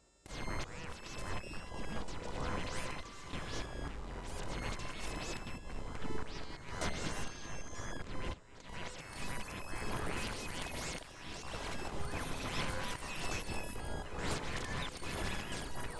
Soundscapes > Synthetic / Artificial
Grain Space 9
electronic, experimental, free, glitch, granulator, noise, packs, sample, samples, sfx, sound-effects, soundscapes